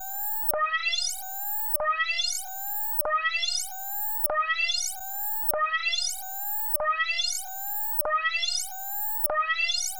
Sound effects > Electronic / Design
A designed alarm SFX created in Phaseplant VST.